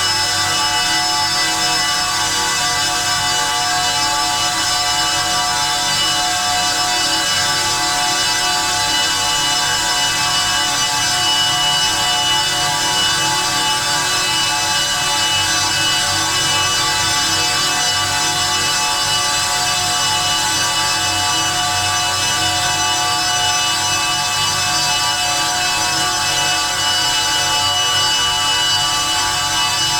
Sound effects > Experimental
"What do I remember about her? The eternal youth of our spring together. She and I, loved." For this upload I recorded ambient noises inside my home using a Zoom H4N multitrack recorder. I then mutated and layered those sounds into this final upload.
ambient, chimes, progressive, drone, alarm, tense, optimistic, layered, zoom-h4n